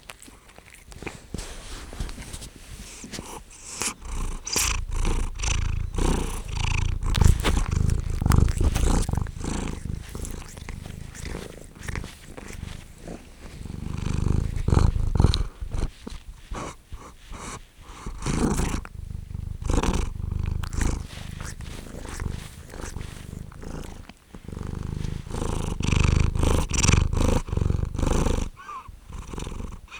Sound effects > Animals
Perran - Happy resting cat sounds
Subject : Perran, a middle aged chonky female cat. Happy resting sounds. Date YMD : 2025 November 13th at 03h30 Location : Albi 81000 Tarn Occitanie France. Hardware : Dji Mic 3 as mic and recorder. Weather : Processing : Trimmed and normalised in Audacity.